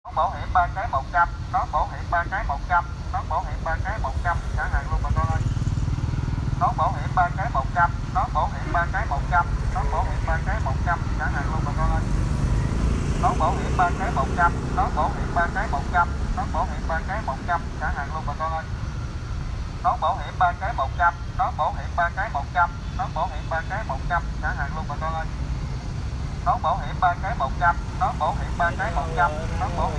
Speech > Solo speech
Nón Bảo Hiển Ba Cái Một Trăm
Man sell helmet say 'Nón bảo hiểm ba cái một trăm, xả hàng luôn bà con ơi'. Record use iPhone 7 Plus smart phone 2025.10.18 16:38
sell, business, viet, male